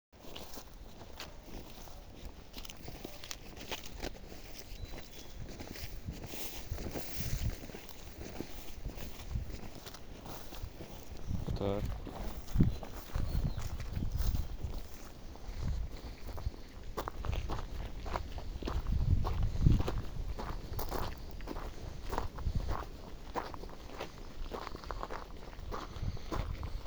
Sound effects > Human sounds and actions
20250511 1922 walk on grass and gravel phone microphone
walk on grass and gravel